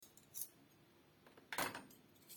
Sound effects > Objects / House appliances
Cutlery clinking, setting it down on the counter
metal, fork, cook, spoon, kitchen, knife, cutlery, rummaging